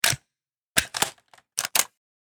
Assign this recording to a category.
Sound effects > Other